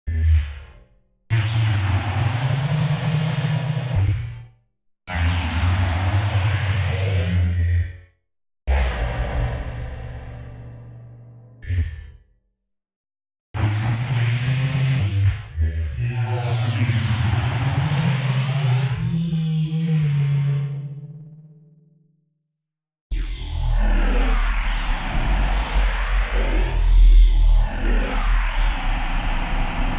Sound effects > Electronic / Design
Hoover Synth Effect
Serum synth using modulation. Good for SFX with some more processing maybe.
saw, hypersaw, dnb, neuro, glitch